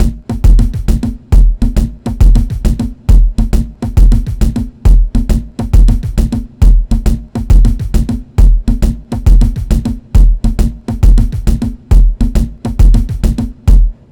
Music > Solo percussion
Yes, it for all! I made this loop for my track on FL Studio (Will soon release)
136BPM, Brazilian, Brazilian-Funk, Loop, Percussion, Samba